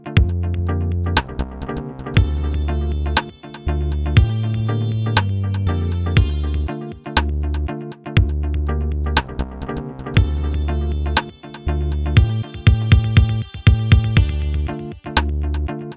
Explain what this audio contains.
Music > Multiple instruments
a chilled ska/dub loop done with strudel - has sitar and tr707